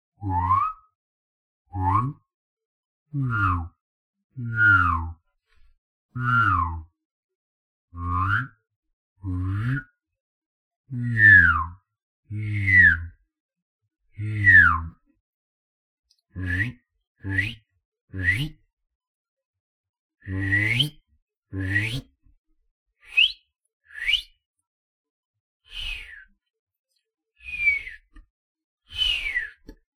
Sound effects > Experimental
Vwippy Vanish Whistles
A sound made with my own mouth by droning and whistling at the same time. Sounds kind of bad on its own, but can be edited and mixed with other sounds to create convincing and interesting effects for when objects appear or disappear out of existence. Adding some reverb or reversing these does a lot to make them sound good, but I wanted to upload the raw versions.
whitle, vanish, voip